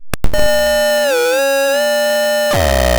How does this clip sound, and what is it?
Sound effects > Electronic / Design
Optical Theremin 6 Osc dry-036
Alien, Analog, Bass, Digital, DIY, Dub, Electro, Electronic, Experimental, FX, Glitch, Glitchy, Handmadeelectronic, Infiltrator, Instrument, Noise, noisey, Optical, Otherworldly, Robot, Robotic, Sci-fi, Scifi, SFX, Spacey, Sweep, Synth, Theremin, Theremins, Trippy